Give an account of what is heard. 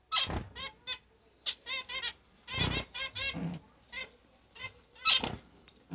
Sound effects > Animals
Songbirds - Zebra Finch; Two Finches Chirping and Flapping Wings
Two zebra finches chirp and flutter in cage.
birds, zebrafinch, finch